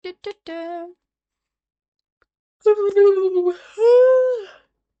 Speech > Solo speech
Follower alert - Made with Clipchamp
Followers want this as a follow sound alert, so... here I am lol